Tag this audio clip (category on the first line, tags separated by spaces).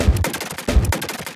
Sound effects > Experimental
hiphop impacts zap snap pop glitchy fx edm idm otherworldy laser percussion glitch alien experimental impact perc abstract clap whizz crack sfx lazer